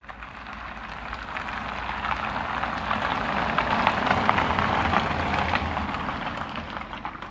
Sound effects > Vehicles
ev driving by